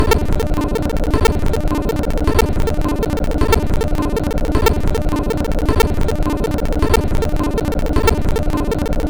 Instrument samples > Percussion
This 211bpm Drum Loop is good for composing Industrial/Electronic/Ambient songs or using as soundtrack to a sci-fi/suspense/horror indie game or short film.
Industrial, Drum, Underground, Packs, Weird, Alien, Dark, Loopable, Samples, Soundtrack, Ambient, Loop